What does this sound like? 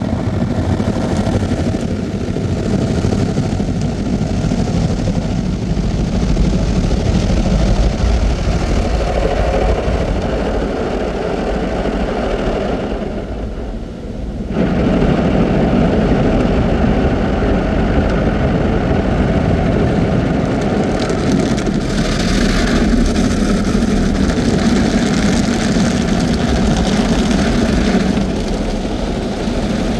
Soundscapes > Other
📍Car Wash 🔊 Soundscape 🌍 Romania
A unique recording of Car Wash Soundscape - Inside the car, somewhere in Romania 🗓️ Date: 13.07.2025 🎙️ Details: A dense sound recording taken during the automatic car wash process.
noise, ambiance, field-recording, ambient, wash, soundscape, car, car-wash, ambience